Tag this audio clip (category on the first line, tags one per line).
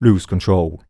Speech > Solo speech
raw
control
Neumann
lose
oneshot
chant
FR-AV2
dry
loose
Vocal
hype
Mid-20s
un-edited
Male
Single-take
U67
singletake
Tascam
voice
Man